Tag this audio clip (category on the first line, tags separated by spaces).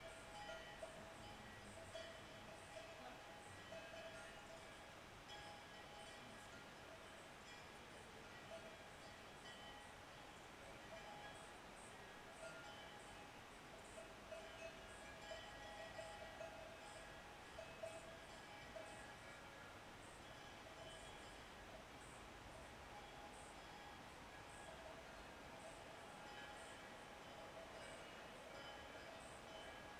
Soundscapes > Nature
cowbells field-recording grassland water